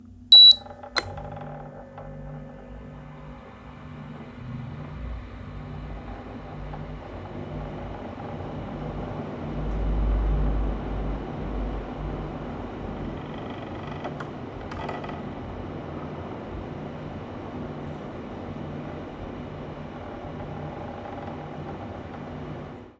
Sound effects > Objects / House appliances
Steady, low hum and airflow from a working air conditioner unit. This is a demo from the full "Apartment Foley Sound Pack Vol. 1", which contains 60 core sounds and over 300 variations. Perfect for any project genre.
airconditioner, ambience, background, roomtone